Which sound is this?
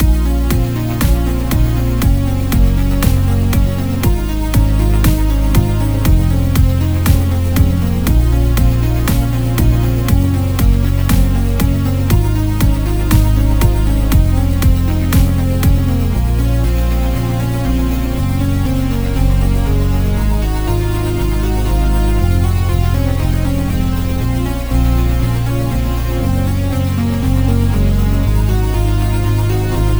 Music > Multiple instruments
Emotional Game Loop - Ruin
B major | 119bpm | 4/4 Originally created as a game soundtrack, but it can also work well in film and animation projects. This track comes from one of my older projects. After rediscovering it some time later, I was genuinely surprised by how it sounded. At the moment, I consider it one of my strongest works. I’ll be happy to adjust them for you whenever I have time!